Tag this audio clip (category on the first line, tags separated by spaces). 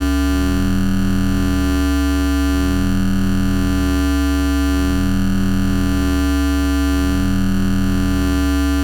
Sound effects > Other mechanisms, engines, machines
IDM Noise Synthetic